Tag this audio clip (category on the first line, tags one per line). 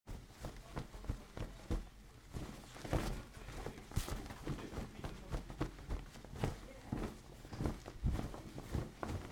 Sound effects > Animals
Birds-flying
bigger-bird
Flapping-wings